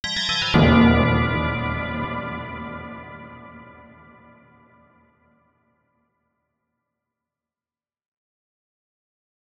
Sound effects > Electronic / Design
Start Mission (Unsettling Chimes)
begin-mission, chimes, eerie-chimes, horror-alarm, horror-chimes, horror-game-chimes, jumpscares, spooky-chimes, startling-chimes, start-mission, strange-chimes, unsettling